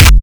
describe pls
Instrument samples > Percussion
BrazilFunk Kick 16 Processed-3
Distorted, BrazilianFunk, Kick, Brazilian, BrazilFunk